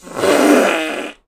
Sound effects > Human sounds and actions
FARTDsgn-Blue Snowball Microphone, CU Short Nicholas Judy TDC
A short fart.
Blue-brand, Blue-Snowball, cartoon, fart, short